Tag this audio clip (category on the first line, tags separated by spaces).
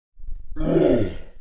Sound effects > Human sounds and actions
Grunt Snarl Creature Monster